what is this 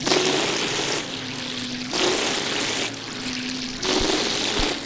Soundscapes > Indoors
The sound a bright splash with a lot of high frequency content Sound recorded while visiting Biennale Exhibition in Venice in 2025 Audio Recorder: Zoom H1essential